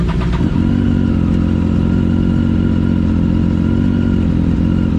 Sound effects > Other mechanisms, engines, machines
clip prätkä (14)
Ducati, Motorcycle